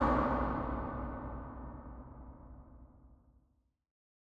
Sound effects > Electronic / Design
UNDERGROUND SUNK EXPLOSION
BASSY, BOOM, DEEP, DIFFERENT, EXPERIMENTAL, EXPLOSION, HIPHOP, HIT, IMPACT, INNOVATIVE, LOW, RAP, RATTLING, RUMBLING, TRAP, UNIQUE